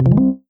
Sound effects > Electronic / Design
LootPickup Feedback
You've found something! A UI-esque, symbolic tell that you've added an item to your inventory. Very much inspired by old survival games à la Resident Evil.